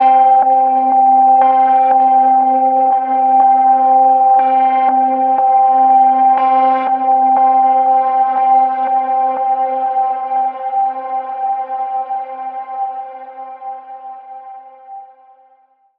Sound effects > Electronic / Design
SLEEP THEORY ONE
A deep, pulsating low-frequency synthesizer tone. The sound features a warm, rounded texture that rhythmically throbs in volume, creating a steady, hypnotic loop useful for ambient textures or background layers.